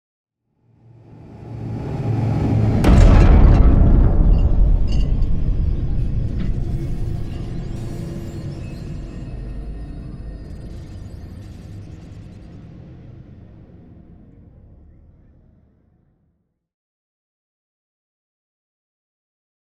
Sound effects > Other
Sound Design Elements Impact SFX PS 058
explosion, strike, sharp, game, impact, force, power, rumble, smash, hit, heavy, design, blunt, audio, thudbang, cinematic, percussive, sfx, transient, collision, hard, effects, crash, sound, shockwave